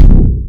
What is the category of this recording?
Instrument samples > Percussion